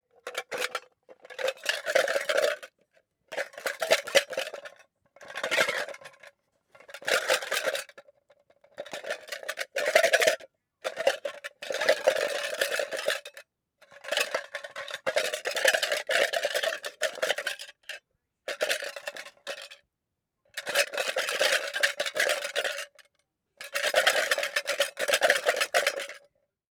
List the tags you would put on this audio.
Sound effects > Human sounds and actions
cans rattle metal metallic sfx clang shaking shake rattling

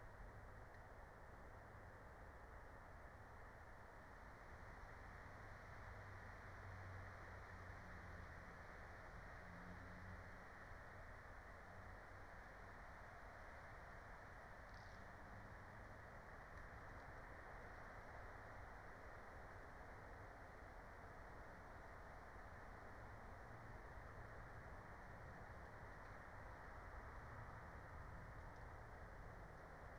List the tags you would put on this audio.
Soundscapes > Nature
meadow raspberry-pi nature field-recording soundscape phenological-recording alice-holt-forest natural-soundscape

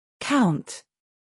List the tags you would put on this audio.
Speech > Solo speech
voice pronunciation english word

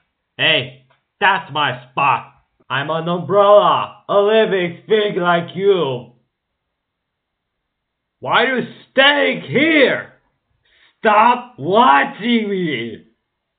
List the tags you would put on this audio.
Speech > Solo speech
talk umbrella speak

